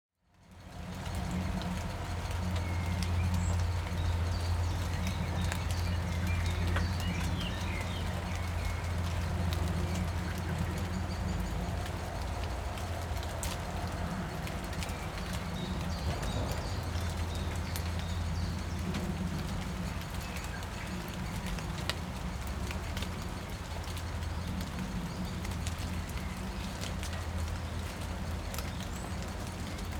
Soundscapes > Urban
Ambience (MONO) recording. At the beginning you can hear light rain. At the recording the rain ceased. You can hear, bird songs, car, train and plains in the far distance at some time.
background, atmo, background-sound, rain, bird, ambience, bavaria, atmospheric, ambiance